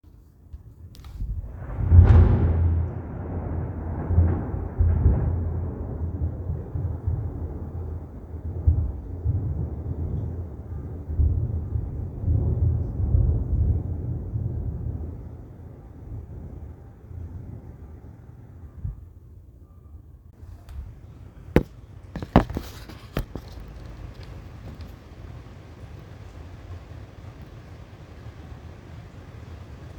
Soundscapes > Nature
Thunderstorm From Indoors
thunderstorm, from indoors, near a window.